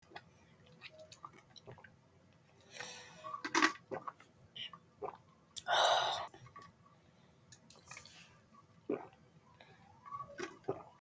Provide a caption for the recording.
Sound effects > Other
I drank water
I was thirsty long ago, I record with the computer
gurgle, teen, water, teenage, drinking